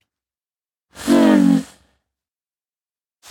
Speech > Other
clown creepy1
Sigh of creepy clown Record with my own voice, edit by Voicemod
clown, sign